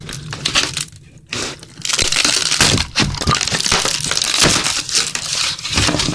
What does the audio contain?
Sound effects > Natural elements and explosions
cracked wood recorded at phone